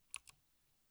Sound effects > Other mechanisms, engines, machines
Single Key Stroke Small Key
A single keystroke of a smaller key on a mechanical keyboard. Recorded using a Pyle PDMIC-78
clicking, keybaord, mechanical, tapping, typing